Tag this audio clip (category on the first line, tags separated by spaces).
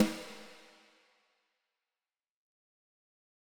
Music > Solo percussion
acoustic beat brass crack drum drumkit drums flam fx hit hits kit ludwig oneshot perc percussion processed realdrum realdrums reverb rim rimshot rimshots roll sfx snare snaredrum snareroll snares